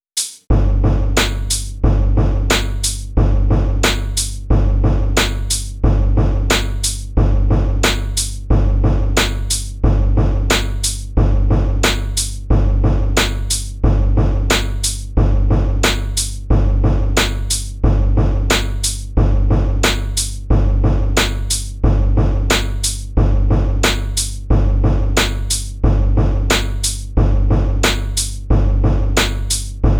Music > Solo percussion
A simple industrial drum loop put together in Ableton Live 12 with the Slackjaw Kit built into the program
Drum, Industrial, Loop, Percussion